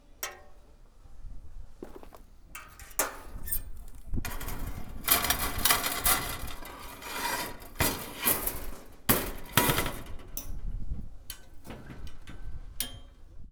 Sound effects > Objects / House appliances
Junkyard Foley and FX Percs (Metal, Clanks, Scrapes, Bangs, Scrap, and Machines) 181
dumpster, Atmosphere, rubbish, Machine, scrape, Bang, FX, rattle, SFX, Metal, Clang, garbage, dumping, waste, Smash, Metallic, trash, Foley, Dump, Robotic, Robot, tube, Perc, Percussion, Clank, Junkyard, Junk, Bash, Ambience, Environment